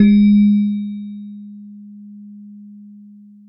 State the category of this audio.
Sound effects > Human sounds and actions